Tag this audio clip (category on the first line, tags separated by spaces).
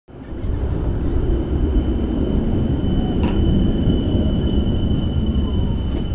Sound effects > Vehicles
tram rail vehicle